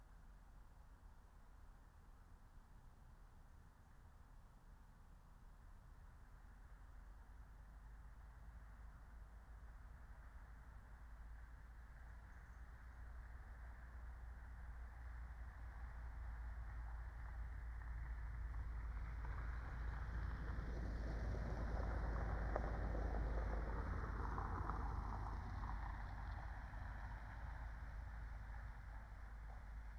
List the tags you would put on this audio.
Soundscapes > Nature
alice-holt-forest soundscape phenological-recording field-recording meadow raspberry-pi natural-soundscape nature

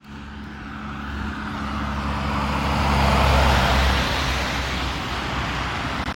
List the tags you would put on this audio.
Soundscapes > Urban
Transport Cars Road

